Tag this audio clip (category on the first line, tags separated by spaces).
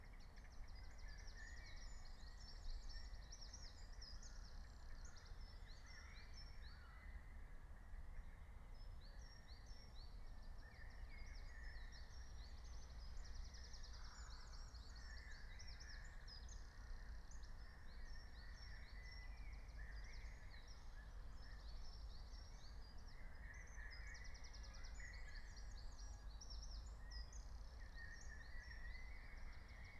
Soundscapes > Nature
alice-holt-forest meadow nature field-recording phenological-recording soundscape natural-soundscape raspberry-pi